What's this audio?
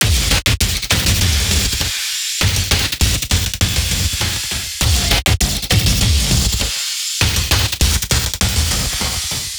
Music > Multiple instruments
fxed exp loop 200bpm
drums, glitch, cyber, loop, processed, electronic, sliced, robotic, robot, 200bpm, experimental